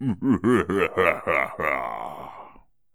Speech > Solo speech
Here's me doing a spooky evil laugh! It's a very simple recording. Recorded with a Blue Yeti and pitched down by 1 octave. Not much else needs to be said... It's just a evil laugh fit for any Halloween themed projects!
evil, deep, spooky, man, voice, male, deepvoice, laughter, human, vocal, laugh, laughing